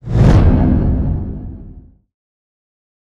Sound effects > Other
Sound Design Elements Whoosh SFX 007
film, effect, design, element, whoosh, swoosh, sweeping, dynamic, ambient, elements, cinematic, trailer, fx, movement, transition, audio, sound, effects, fast, motion, production